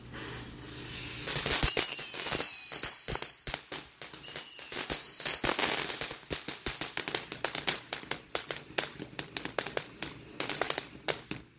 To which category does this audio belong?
Sound effects > Natural elements and explosions